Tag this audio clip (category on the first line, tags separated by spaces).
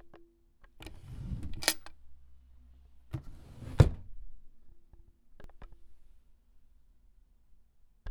Sound effects > Objects / House appliances
open dresser drawer